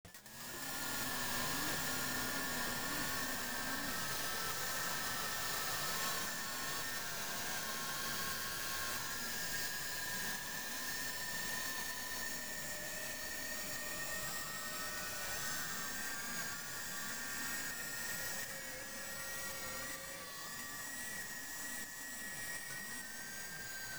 Soundscapes > Synthetic / Artificial
Grain Gremlins 2
These are my first experiments with a granulator. I believe there will be more volumes. Sounds are suitable for cinematic, horror, sci-fi film and video game design.
glitch, packs, sample, samples, sfx, sound